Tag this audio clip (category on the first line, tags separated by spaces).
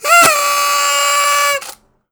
Sound effects > Objects / House appliances
blow blowout Blue-brand Blue-Snowball cartoon flap horn party toot